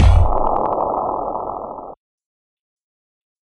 Sound effects > Electronic / Design
Impact Percs with Bass and fx-012
bash, bass, brooding, cinamatic, combination, crunch, deep, explode, explosion, foreboding, fx, hit, impact, looming, low, mulit, ominous, oneshot, perc, percussion, sfx, smash, theatrical